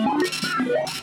Sound effects > Electronic / Design
Vintage computer -Error report
A vintage computer or robot reporting an error. It is a recording of an old printer mixed with an old synth.